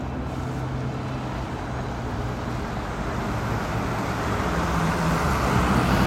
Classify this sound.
Soundscapes > Urban